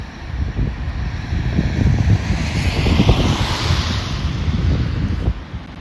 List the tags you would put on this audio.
Soundscapes > Urban
car traffic veihcle

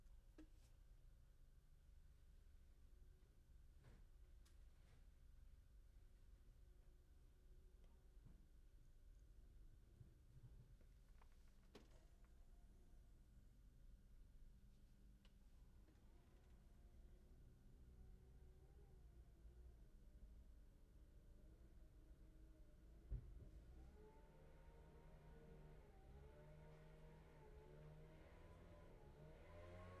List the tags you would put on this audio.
Sound effects > Other mechanisms, engines, machines
LAWNMOWER INTERIOR YARDWORK INT

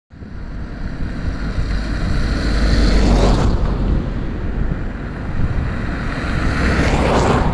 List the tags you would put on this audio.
Sound effects > Vehicles
car traffic vehicle

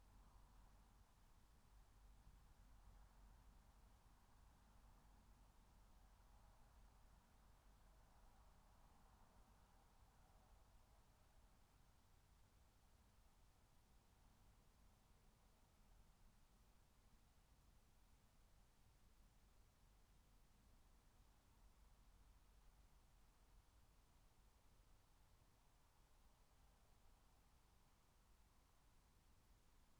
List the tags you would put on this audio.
Soundscapes > Nature
nature soundscape natural-soundscape field-recording raspberry-pi phenological-recording alice-holt-forest meadow

